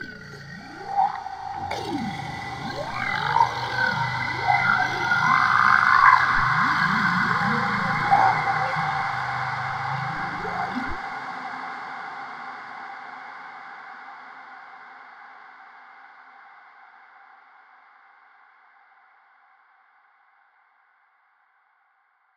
Sound effects > Experimental
Creature Monster Alien Vocal FX-60
visceral, devil, Sounddesign, Vocal, gamedesign, Otherworldly, boss, Groan, Reverberating, Frightening, Ominous, demon, Snarl, Monster, Alien, Fantasy, gutteral, scary, evil, Echo, Deep, Animal, Sound, Vox, fx, Monstrous, sfx, Creature, Snarling, Growl